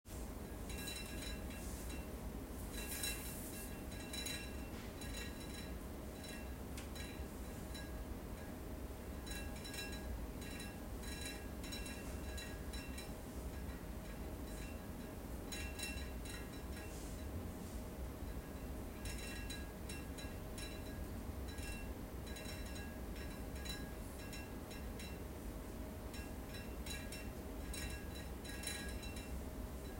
Soundscapes > Indoors
Library air-conditioner sound
Sound inside of a library in cesena
italy, cesena, field-recording